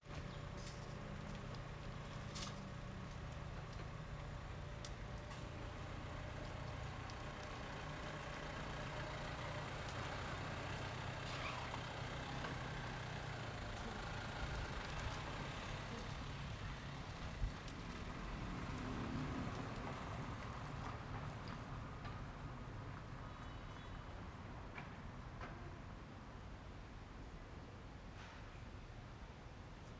Sound effects > Human sounds and actions
MALLORCA TOWN 04
Recorded around a mixed-use neighbourhood of Palma in the early evening. 4 lanes of traffic including busses driving by, the banging of flower market being dismantled can be heard sometimes. People talking etc. Recorded with a Zoom H6, compressed slightly